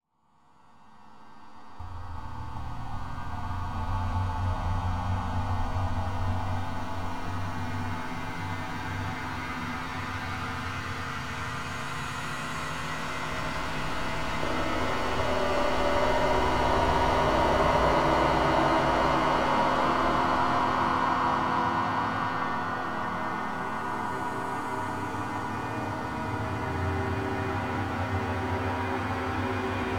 Soundscapes > Synthetic / Artificial
ambient drone landscape texture alien evolving experimental atmosphere dark shimmer shimmering glitchy glitch long low rumble sfx fx bass bassy synthetic effect ambience slow shifting wind howl roar